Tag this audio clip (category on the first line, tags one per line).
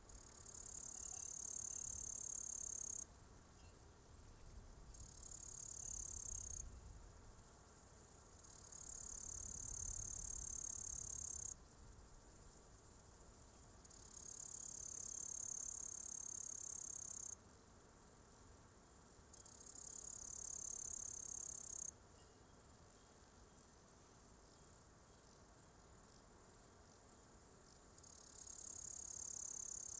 Soundscapes > Nature
Orthoptera
summer